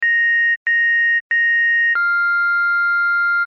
Sound effects > Electronic / Design
[200th sound] Lenovo desktop RAM error beep
The beeping noise from the motherboard buzzer heard on Lenovo computers such as my Lenovo Legion T5 26IAB7 when there is an issue with the RAM. It is most commonly heard if you install a defective RAM memory module (such as when I was upgrading to 32GB DDR5) and will keep repeating as long as the computer is on. It can also be heard if the graphics card is not installed in the computer.
alarm, alert, beep, beeping, computer, ddr5, desktop, dimm, error, gpu, lenovo, malfunction, memory, pc, ram, signal, warning